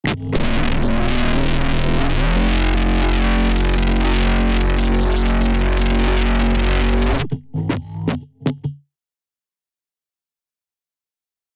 Sound effects > Electronic / Design
Optical Theremin 6 Osc Shaper Infiltrated-004
Sounds from an Optical Theremin I built from scratch that uses 3 Main Oscillators all ring modded to one another , each Oscillator is connected to 2 Photoresistors and an old joystick from PS2 controllers. The sounds were made by moving the unit around my studio in and out of the sun light coming through the skylights. further processing was done with Infiltrator, Rift, ShaperBox, and Reaper